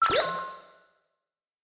Sound effects > Other
scan sound effect
sound, scan, effect